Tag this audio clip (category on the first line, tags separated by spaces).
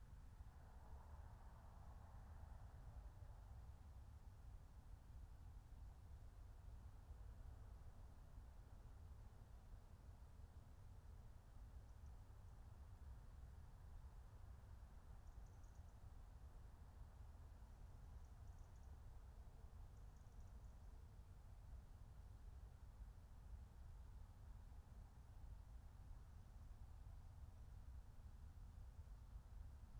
Soundscapes > Nature
nature alice-holt-forest natural-soundscape soundscape field-recording raspberry-pi meadow phenological-recording